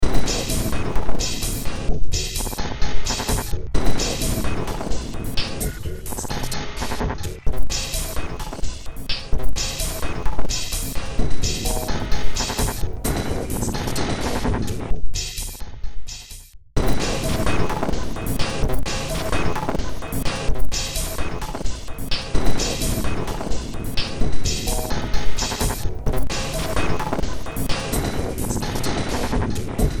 Music > Multiple instruments
Short Track #3570 (Industraumatic)
Track taken from the Industraumatic Project.
Industrial, Noise